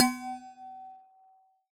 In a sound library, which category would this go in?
Sound effects > Objects / House appliances